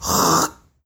Sound effects > Objects / House appliances
HMNSpit-Samsung Galaxy Smartphone, CU Hawk Nicholas Judy TDC
Phone-recording, human